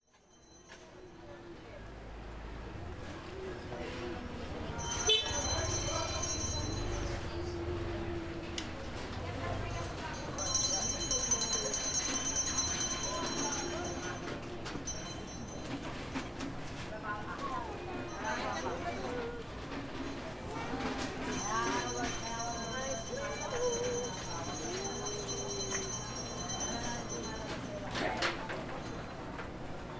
Soundscapes > Urban
Tondo, Manila Philippines
Tondo is home to bustling market shops known for their affordable prices and lively street trade, a soundscape shaped by movement, chatter, and everyday enterprise. Specific sounds you can hear: mass markets, people chatter, bargaining voices, vehicle sounds, and more.
Divisoria, Field-Recording, Mass-market, Vehicles, Market, Tondo, People, Street, Urban, Jeepneys, Manila